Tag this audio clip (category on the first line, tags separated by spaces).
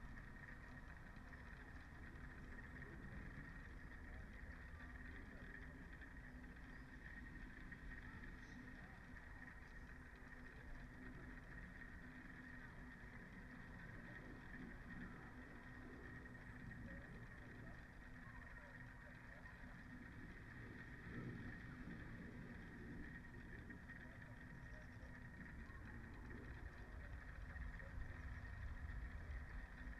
Soundscapes > Nature
data-to-sound modified-soundscape nature phenological-recording weather-data